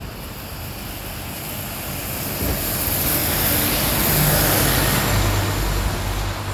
Soundscapes > Urban
Bus moving 25 mph
Bus moving at 25 miles per hour: Rusty sound of gravel on the road, revving engine, street background sound. Recorded with Samsung galaxy A33 voice recorder. The sound is not processed. Recorded on clear afternoon winter in the Tampere, Finland.
bus, field-recording, street, traffic